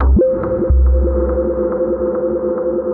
Instrument samples > Synths / Electronic
CVLT BASS 1
bass stabs drops bassdrop sub subs subbass subwoofer low lowend clear wobble lfo wavetable synthbass synth
bass, bassdrop, clear, drops, lfo, low, lowend, stabs, sub, subbass, subs, subwoofer, synth, synthbass, wavetable, wobble